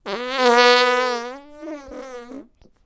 Sound effects > Human sounds and actions
Imitation of a fart done by my kid who is blowing air on his arm :)